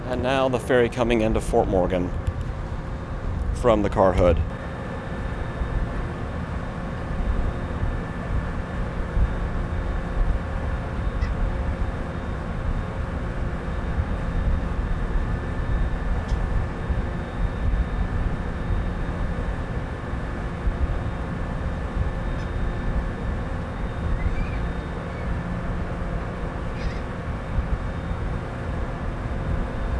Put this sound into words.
Soundscapes > Urban
Ferry between Dauphin Island and Fort Morgan, Alabama docking. Summer late afternoon, engines, passengers, seagulls, wind.